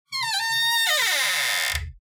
Sound effects > Other mechanisms, engines, machines
Squeaky Hinge
Creaky, Hinge, Squeaky